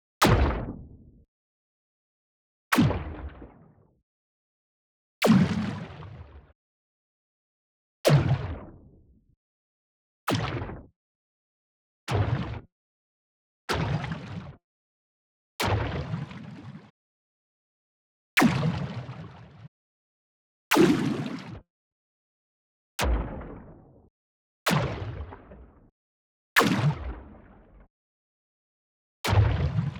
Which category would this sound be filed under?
Sound effects > Experimental